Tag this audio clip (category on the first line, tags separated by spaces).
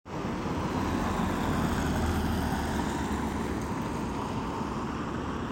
Sound effects > Vehicles
car tampere